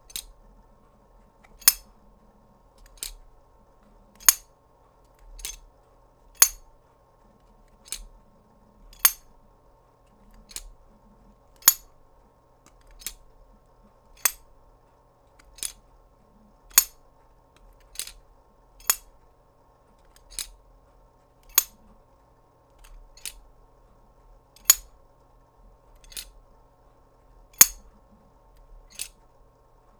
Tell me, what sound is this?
Sound effects > Objects / House appliances
A tiny metal door opening and closing.